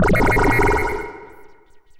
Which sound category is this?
Instrument samples > Synths / Electronic